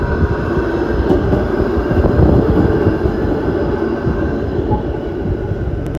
Soundscapes > Urban
ratikka1 copy
The tram driving by was recorded In Tampere, Hervanta. The sound file contains a sound of tram driving by. I used an Iphone 14 to record this sound. It can be used for sound processing applications and projects for example.
traffic, tram